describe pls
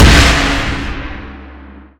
Instrument samples > Percussion
📀 A mediocre seminice cymbal resulted from low-pitching and merging old crashes and one gong files of mine. Search my crash and gong folder.
metal, bang, china, multicrash, polycrash, Zultan, Istanbul, metallic, clash, orchestral, Paiste, sinocrash, cymbal, smash, spock, crunch, low-pitched, Meinl, clang, Stagg, sinocymbal, Soultone, crack, cymbals, Sabian, crash, Zildjian